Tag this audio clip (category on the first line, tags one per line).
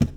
Sound effects > Objects / House appliances
foley
water
container
plastic
hollow
household
scoop